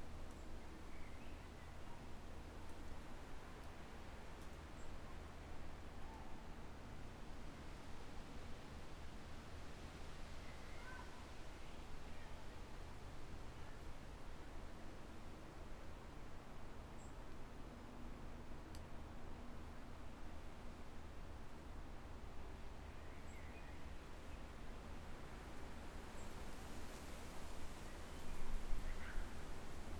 Soundscapes > Urban
AMBPark In the rhododendron bush, distant grass cutting at Brunnsparken, Ronneby, Sweden
Recorded 14:46 09/06/25 Inside a large rhododendron bush. A very windy day in the park, but a hill blocks out most of the wind here. There's leaves rustling, somewhat because of blackbirds rummaging by the ground. Some bird sounds from seagulls too. In the distance there's a park maintinence vehicle used for cutting grass, as well as some traffic. Zoom H5 recorder, track length cut otherwise unedited.
Ronneby, Blackbirds, Bush, Leaves, Sweden, Windy, People, Field-Recording, Traffic, Trees, Park, Distant, Brunnsparken, Rummaging, Maintenance, Inside